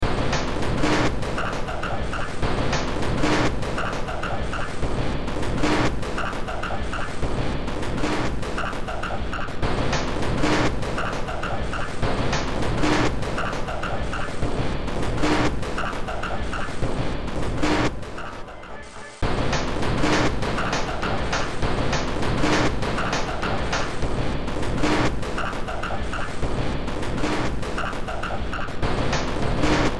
Music > Multiple instruments
Short Track #3335 (Industraumatic)
Soundtrack, Games, Underground, Horror, Noise, Ambient, Sci-fi, Cyberpunk, Industrial